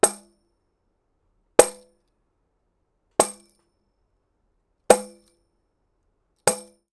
Music > Solo percussion
MUSCPerc-Blue Snowball Microphone, CU Tambourine Hits, X5 Nicholas Judy TDC
Five tambourine hits.